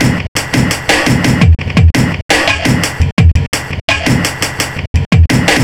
Music > Other
breakbeat 170 bpm drill and bass
FL studio 9 . vst slicex découpe de sample